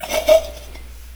Sound effects > Objects / House appliances
aluminum can foley-019
fx, metal, foley, alumminum, household, water, can, tap, scrape, sfx